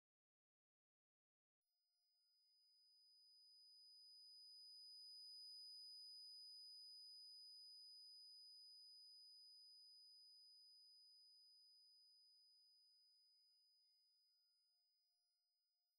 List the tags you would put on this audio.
Sound effects > Electronic / Design
horror
tinnitus
highpitch
short
sounddesign
atmospheric
ingingsound
highfrequency
earring
earwhistle
soundeffect

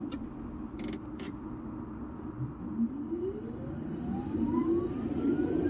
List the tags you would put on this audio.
Soundscapes > Urban
finland
hervanta
tram

